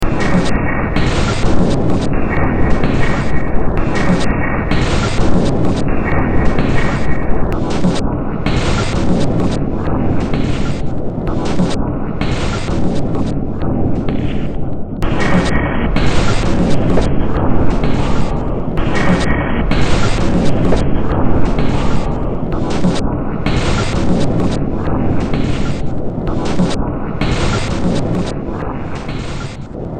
Music > Multiple instruments
Demo Track #3894 (Industraumatic)
Ambient, Noise, Soundtrack, Cyberpunk, Underground, Industrial, Horror